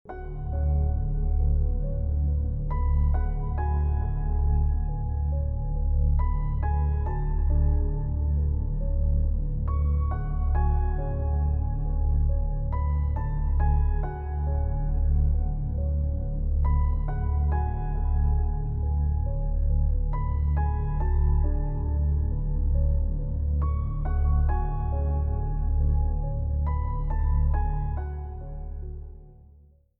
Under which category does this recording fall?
Music > Multiple instruments